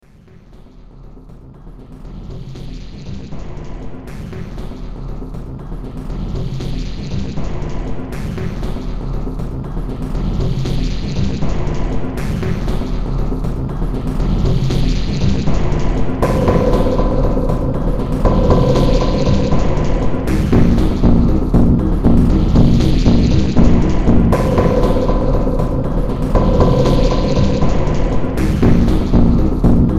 Music > Multiple instruments
Demo Track #3559 (Industraumatic)
Underground, Games